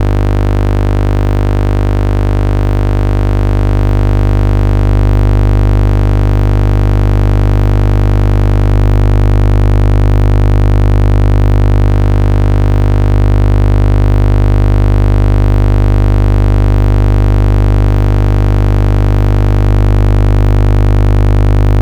Sound effects > Objects / House appliances
Electromagnetic field recording of a Nespresso machine while making coffee. Electromagnetic Field Capture: Electrovision Telephone Pickup Coil AR71814 Audio Recorder: Zoom H1essential